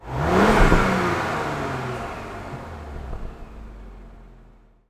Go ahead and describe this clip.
Sound effects > Vehicles
2019 Porsche Cayenne Revving
Recorded from the exhaust from the car, mixed with the recording from the engine.
vehicle, motor, porche, rev, revving, 2019, engine, car, porsche, automobile, Cayenne